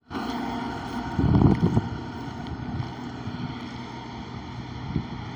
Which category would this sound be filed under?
Sound effects > Vehicles